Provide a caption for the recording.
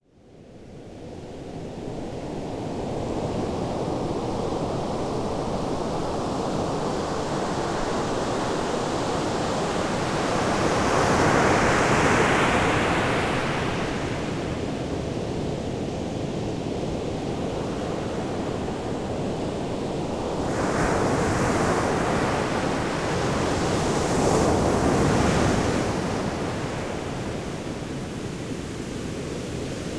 Soundscapes > Nature
waves - sandspit - 12.10.25
Choppy waves breaking on a sandy shore.
ocean, beach, sea